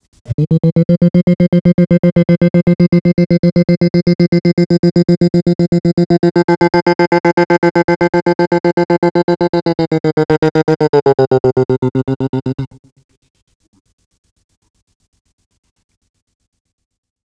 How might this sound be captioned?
Speech > Processed / Synthetic
wrecked vox 20
glitch, sounddesign, sound-design, abstract, dark, vocal, animal, vocals, vox, glitchy, spooky, effect, pitch, atmosphere, otherworldly, howl, monster, reverb, shout, alien, sfx, strange, fx, wtf, weird, processed, growl